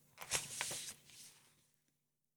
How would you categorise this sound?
Sound effects > Objects / House appliances